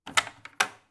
Sound effects > Other mechanisms, engines, machines
Woodshop Foley-100
rustle, tink, bam, sfx, bop, sound, knock, oneshot, percussion, metal, pop, fx, foley, little, strike, wood, thud, shop, boom, perc, tools, bang, crackle